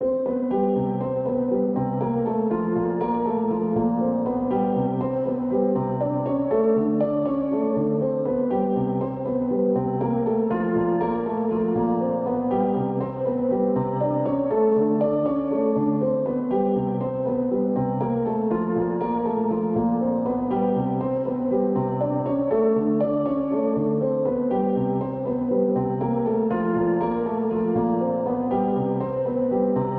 Music > Solo instrument
Piano loops 022 efect 4 octave long loop 120 bpm
120, 120bpm, free, loop, music, piano, pianomusic, reverb, samples, simple, simplesamples